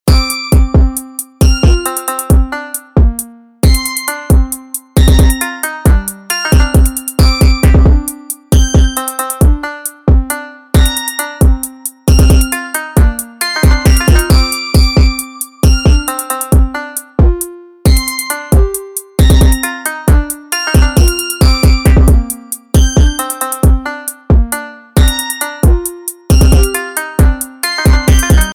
Music > Multiple instruments
I'm Just Chillin' Out Ringtone
If you like calm, chill, and relaxing ringtones, then this might just be the ringtone that you are looking for. It has a nice guitar sound as well. This sound is soothing, and great to listen to when trying to get some stress relief.